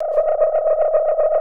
Sound effects > Electronic / Design
laser gun 3
Laser gun sound designed for a sci-fi videogame. It sounds like uhhhhhhhhhhhhhhhhhhhhhhh.
electronic, gun, laser, lasergun, sci-fi, sfx, shooting, videogame, videogames, weapon